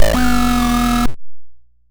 Sound effects > Electronic / Design
Optical Theremin 6 Osc dry-058
Spacey, Sci-fi, Robotic, Sweep, Infiltrator, Bass, Glitch, Trippy, Glitchy, Robot, Synth, Electro, Scifi, noisey, FX, Dub, Digital, Electronic, SFX, Theremin, Optical, Analog, Theremins, Otherworldly, Alien, Noise, Instrument, DIY, Experimental, Handmadeelectronic